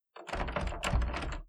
Sound effects > Objects / House appliances
Door Jammed/Locked Free
A brief sound of trying to open a door that is jammed or locked shut.
close, door, handle, Jammed, lock, locked, open, shut